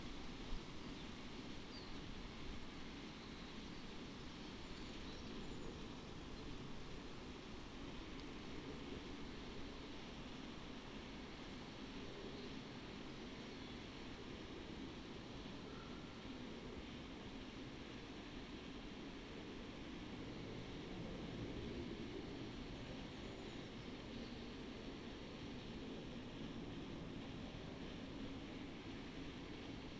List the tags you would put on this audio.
Soundscapes > Nature
alice-holt-forest field-recording raspberry-pi

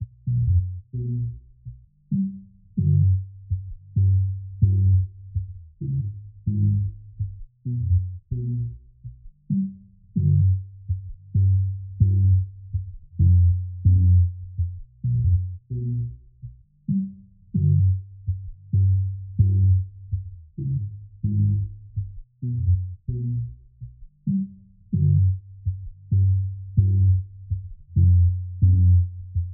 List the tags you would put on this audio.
Music > Solo instrument
130bpm 65bpm bass dance electronic glitched loop synth synth-bass techno

